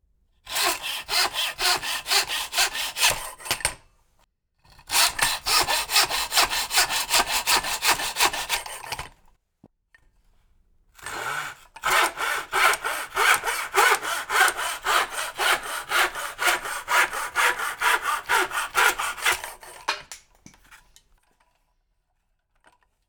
Sound effects > Other mechanisms, engines, machines
hand miter saw cutting trim
A thin kerf hand miter saw cutting wood trim. Minimal processing, hard limiter and normalization only. No NR, compression or EQ. #0:00 two takes cutting quarter round molding #0:10 one take cutting base board Recorded on Zoom F6 with AT2020 microphone.
hand-tools, Miter-saw, saw, woodshop, woodworking